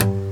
Music > Solo instrument
Acoustic Guitar Oneshot Slice 65
acoustic guitar oneshot shorts, knocks, twangs, plucks, notes, chords recorded with sm57 through audiofuse interface, mastered with reaper using fab filter comp
acoustic, chord, foley, fx, guitar, knock, note, notes, oneshot, pluck, plucked, sfx, string, strings, twang